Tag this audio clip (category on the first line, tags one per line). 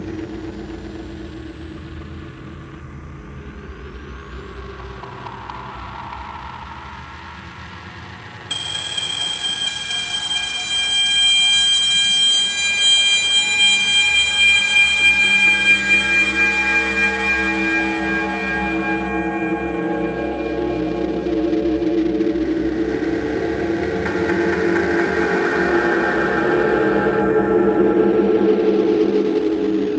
Music > Solo instrument
bass
bassline
basslines
blues
chords
chuny
electric
electricbass
funk
fuzz
harmonic
harmonics
low
lowend
note
notes
pick
pluck
riff
riffs
rock
slap
slide
slides